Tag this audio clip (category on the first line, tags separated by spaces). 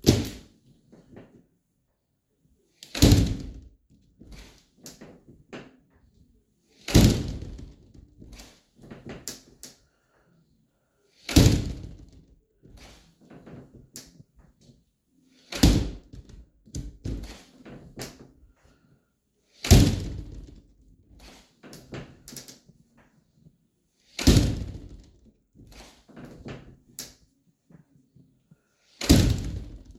Sound effects > Objects / House appliances
Phone-recording shower close open door foley